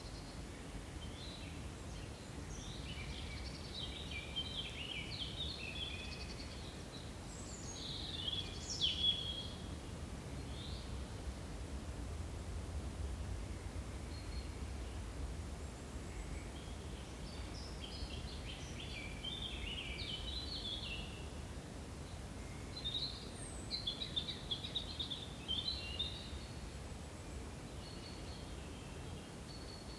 Soundscapes > Nature
20250418 18h00-21h00 - Gergueil forest chemin de boeuf
Subject : One of a few recordings from 10h37 on Friday 2025 04 18, to 03h00 the Saturday. Date YMD : 2025 04 18 Location : Gergueil France. "Chemin de boeuf". GPS = 47.23807497866109, 4.801344050359528 ish. Hardware : Zoom H2n MS mode (decoded in post) Added wind-cover. Weather : Half cloudy, little to no wind until late evening where a small breeze picked up. Processing : Trimmed and Normalized in Audacity.
21410, Rural, windless, Mid-side, France, Cote-dor, country-side, nature, Bourgogne-Franche-Comte, field-recording, Gergueil, Zoom-H2N, H2N, April, 2025, MS, ambiance, Forest, birds